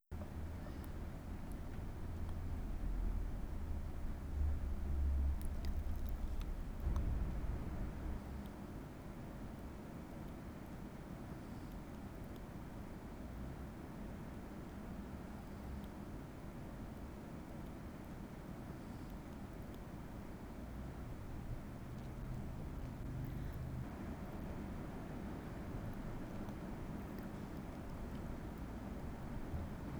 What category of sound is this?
Soundscapes > Indoors